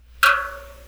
Sound effects > Objects / House appliances
aluminum can foley-017
alumminum can foley metal tap scrape water sfx fx household